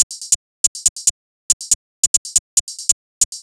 Music > Multiple instruments
UK Double Hat Pattern - 140bpm
A Hihat pattern at 140bpm, with a main Hihat and a quieter 1 filling in 1/4 beats.
140, 2, bpm, double, Drill, Grime, hat, HH, Hihat, loop, pattern, Trap, UK